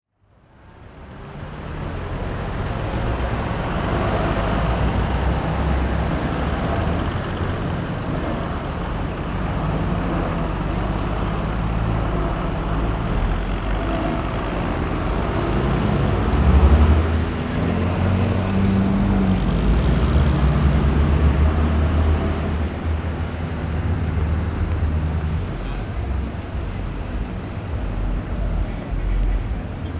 Soundscapes > Urban
Trafico en el parque San Salvador
Ambient sound. Traffic sound at a park in central San Salvador. We can hear voices on the background.
ambient, america, central, el, engines, field, park, recording, salvador, san, traffic